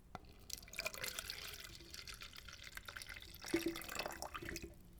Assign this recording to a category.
Sound effects > Objects / House appliances